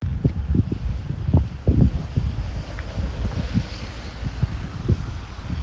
Sound effects > Vehicles
car passing WINDY
Car passing by on a wet asphalt road approximately 20 meters away, with a constant strong wind distorting the recording. Recorded in an urban setting in a near-zero temperature, using the default device microphone of a Samsung Galaxy S20+.
car, road, asphalt, wind